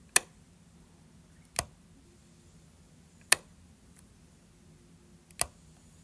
Sound effects > Objects / House appliances
Light switch turning on and off
Thanks. i’ll make it a little scavenger hunt for me
click, effect, electric, flick, household, lamp, light, off, plastic, sound, switch, toggle